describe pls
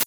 Instrument samples > Synths / Electronic
databent closed hihat 6
A databent closed hihat sound, altered using Notepad++
databending, glitch, hihat, percussion